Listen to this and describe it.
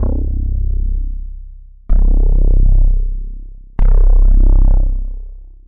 Instrument samples > Synths / Electronic
syntbas0011 C-x3ef
VSTi Elektrostudio (Model Mini)
bass, synth, vst, vsti